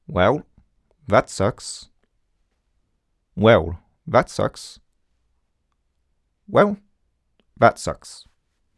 Speech > Solo speech
Sadness - Well that sucks (multi-take)
NPC, Mid-20s, Human, voice, Tascam, Man, To-be-edited, Voice-acting, Male, Vocal, U67, Neumann, talk, Sadness, dialogue, Video-game, sad, FR-AV2, Multi-take